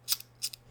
Sound effects > Other
LIGHTER.FLICK.3
Flicks, Lighter, zippo